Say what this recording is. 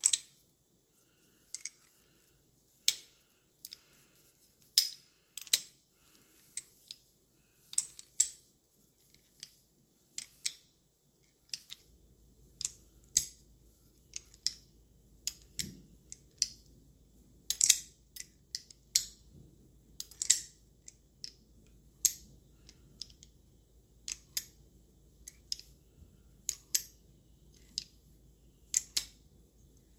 Sound effects > Objects / House appliances
FOODCook-Samsung Galaxy Smartphone, CU Garlic Press, Press Garlic Nicholas Judy TDC
A garlic press pressing garlic.